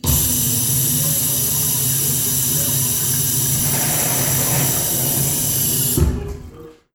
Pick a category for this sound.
Sound effects > Objects / House appliances